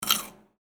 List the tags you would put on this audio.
Sound effects > Human sounds and actions
chips crunch eat snack

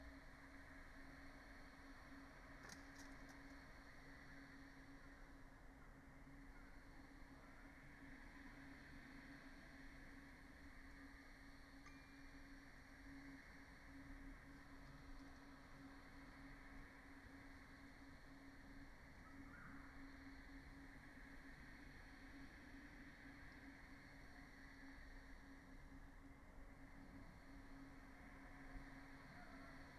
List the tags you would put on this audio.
Soundscapes > Nature
phenological-recording alice-holt-forest data-to-sound soundscape weather-data raspberry-pi modified-soundscape artistic-intervention nature field-recording sound-installation natural-soundscape Dendrophone

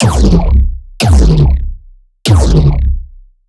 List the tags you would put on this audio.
Sound effects > Other
railgun rifle sci-fi semi-automatic

